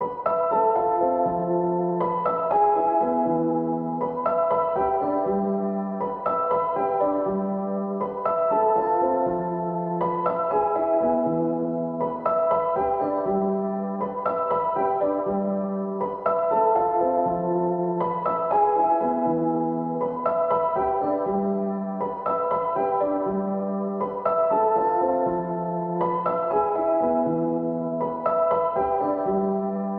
Music > Solo instrument
Piano loops 180 efect 4 octave long loop 120 bpm
free, music, piano, simplesamples, pianomusic, 120, 120bpm, reverb, loop, samples, simple